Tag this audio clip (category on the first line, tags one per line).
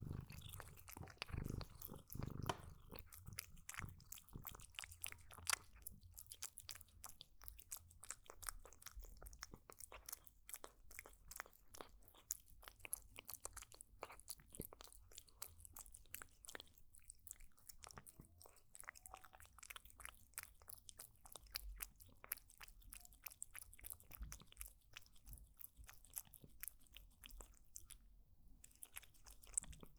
Sound effects > Animals
animal
cat
chewing
closeup
eating
foley
licking
mouthsounds
pet
sfx
texture
wetfood